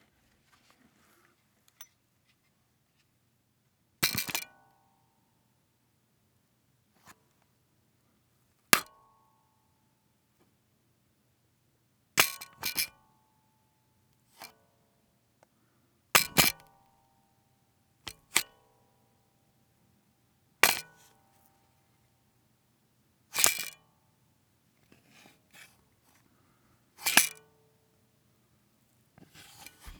Sound effects > Other mechanisms, engines, machines
Glock17 Magazine
Insertion and removal of a Glock 17's magazine from its magazine well. GLOCK 17 MAGAZINE
Firearm, Pistol